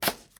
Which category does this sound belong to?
Sound effects > Other